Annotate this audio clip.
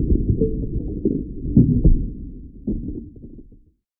Sound effects > Electronic / Design
UnderWater Explosion3
A series of underwater Explosions, Rather Small explosions to be exact and not that Far, made with Pigments via Studio One, The audio was made using a sample of Rocks Debris
Rumble, Explosion